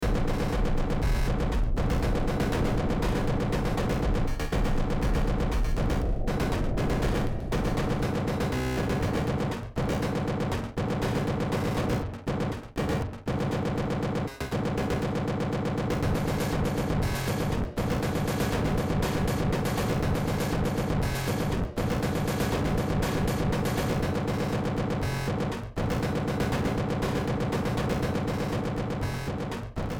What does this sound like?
Music > Multiple instruments
Demo Track #3597 (Industraumatic)
Ambient, Cyberpunk, Games, Horror, Industrial, Noise, Sci-fi, Soundtrack, Underground